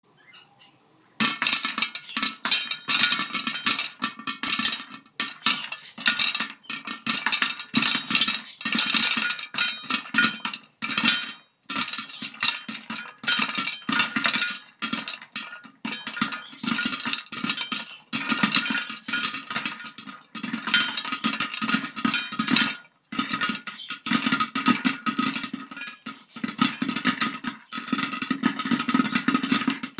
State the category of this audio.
Soundscapes > Other